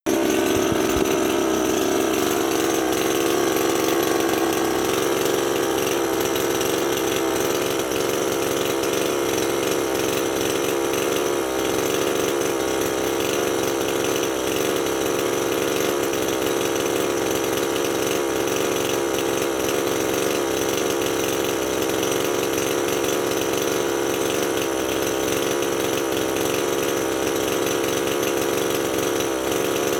Sound effects > Other mechanisms, engines, machines
Gasoline grass trimmer; take two
Take two of the same grass trimmer i did a bit under a year ago. Idles for about 30 seconds, then running through RPMs. #0:00 idling #0:35 raising throttle #0:40 raising throttle again #0:46 about half-throttle #0:52 raising throttle again #0:56 almost full-throttle #0:59 holding full-throttle #1:03 dropping throttle #1:07 two small revs (aka full-throttle from idle) #1:13 cutting ignition off (shut off)